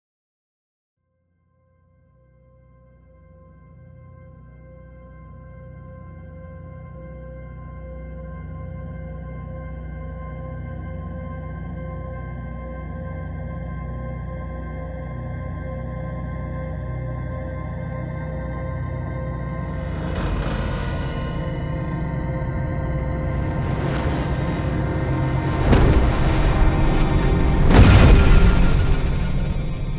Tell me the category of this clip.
Sound effects > Other